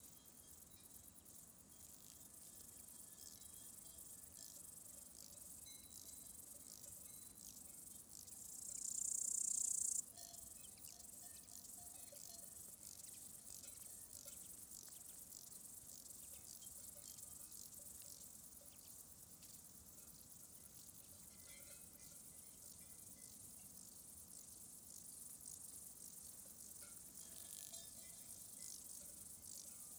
Soundscapes > Nature
Fourroniere - gomphocerus sibiricus
Various stridulations of gomphocerus sibiricus grasshoppers, in the Parc des Écrins mountains, french Alps. Bells of a flock of sheep in the background. Stridulations variées de sauterelles gomphocerus sibiricus , Parc des Écrins, dans les Alpes. Les cloches d'un troupeau de brebis proches. Sennheiser MKH30&MKH50, Decoded MS stereo. July 2025